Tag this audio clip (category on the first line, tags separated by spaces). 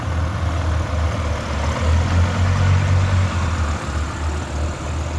Sound effects > Vehicles
bus transportation